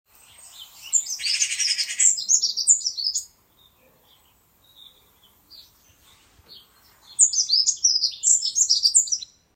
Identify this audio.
Soundscapes > Nature
Bird sound
Birds in Calanques National Park (France).
Calanques, animal